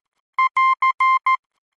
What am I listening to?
Sound effects > Electronic / Design
Morse SignoMas
A series of beeps that denote the plus sign in Morse code. Created using computerized beeps, a short and long one, in Adobe Audition for the purposes of free use.
Morse, Language, Telegragh